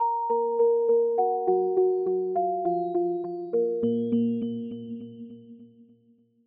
Music > Solo instrument
Apple A Day Synth Keys - 102BPM A# Minor
Synth key melody in A# minor at 102 BPM. Made using Vital in REAPER.